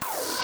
Sound effects > Experimental
Gritch Glitch snippets FX PERKZ-022
clap, percussion, abstract, impact, sfx, alien, perc, impacts, whizz, idm, laser, fx, crack, edm, glitch, snap, lazer, glitchy, hiphop, pop, experimental, otherworldy, zap